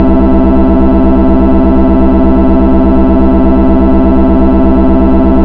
Sound effects > Other mechanisms, engines, machines
EMD 567 engine notch 4 (synth recreation)
This sound is great for train simulators!
notch, mover, rail